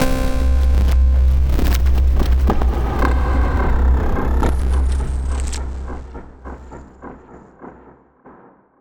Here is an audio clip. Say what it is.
Instrument samples > Synths / Electronic
CVLT BASS 73
subs, stabs, lowend, subbass, drops, clear, bass, synthbass, bassdrop, lfo, wavetable, subwoofer, sub, low, synth, wobble